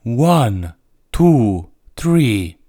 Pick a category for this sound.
Speech > Solo speech